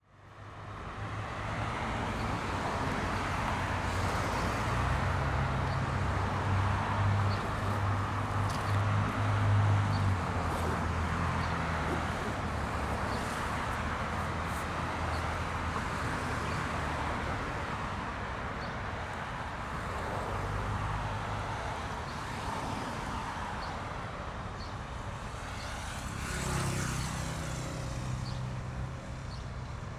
Soundscapes > Urban
Urban Spring avenue - Washington, DC
Stereo recording of a busy avenue through Washington, DC at midday. Traffic, scooters, mopeds, trucks, birds.
city, noise, ambience, spring, urban, field-recording, traffic, soundscape, scooter, ambient, street, car, moped, cars, birds